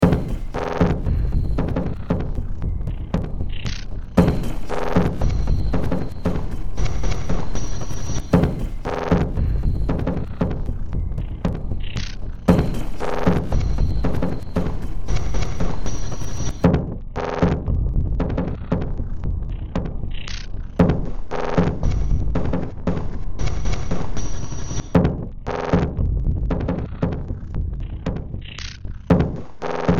Music > Multiple instruments
Demo Track #3697 (Industraumatic)
Soundtrack, Games, Underground, Horror, Ambient, Industrial, Cyberpunk, Sci-fi, Noise